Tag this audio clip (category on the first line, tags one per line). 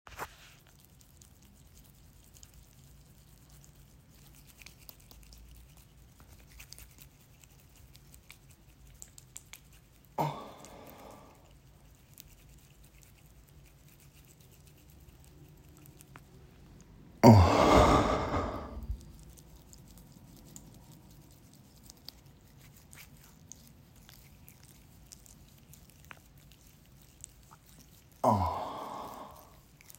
Sound effects > Human sounds and actions
Man
Wet
moans